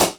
Instrument samples > Percussion
hi-hat minicymbal picocymbal click metal metallic tick bronze brass cymbal-pedal drum drums percussion hat hat-cymbal closed-hat closed-cymbals chick-cymbals hat-set snappy-hats facing-cymbals dark crisp dark-crisp Zildjian Sabian Meinl Paiste Istanbul Bosporus It's a bass hi-hat. This is a bass hi-hat wavefile based on a namesake re-enveloped crash file you can find in my crash folder.